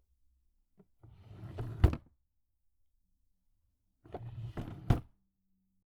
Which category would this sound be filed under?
Sound effects > Objects / House appliances